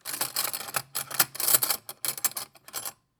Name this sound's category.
Sound effects > Objects / House appliances